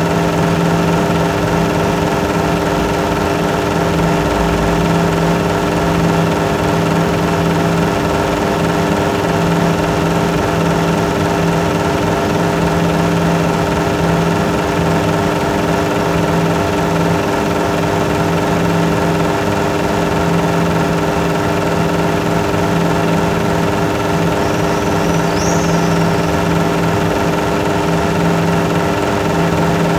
Sound effects > Other mechanisms, engines, machines
250710 21h10 Esperaza - Vent from Chez Charlie butcher
Subject : The vent from "chez charlie" butcher shop. It's a prominent sound in the main street. It's not the main vent box that seems disabled, it's one from a little alley slightly up. Sennheiser MKE600 with stock windcover P48, no filter. Weather : Clear sky, little wind. Processing : Trimmed in Audacity.
11260, 2025, air, air-vent, Aude, Esperaza, fan, FR-AV2, Hypercardioid, Juillet, July, machiery, MKE-600, MKE600, Sennheiser, Shotgun-mic, Shotgun-microphone, Single-mic-mono, street, Tascam, vent